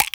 Sound effects > Objects / House appliances
Pill Bottle Cap Open 2
meds, shaking, bottle, pill, shake